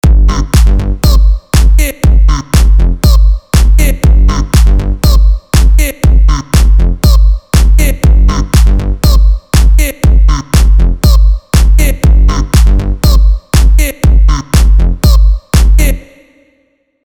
Music > Multiple instruments
drums bass + vocal 120 bpm A simple composition I made with nexus. This composition is fantastic. Ableton live.
120, bass, beat, bpm, dance, drum, drums, loop, rhythm, vocal